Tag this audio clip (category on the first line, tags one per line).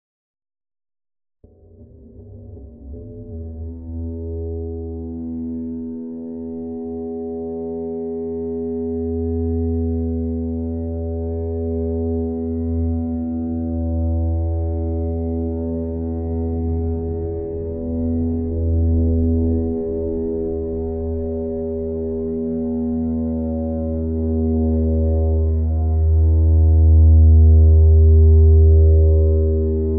Soundscapes > Synthetic / Artificial
alien
ambience
ambient
atmosphere
bass
bassy
dark
drone
effect
evolving
experimental
fx
glitch
glitchy
howl
landscape
long
low
roar
rumble
sfx
shifting
shimmer
shimmering
slow
synthetic
texture
wind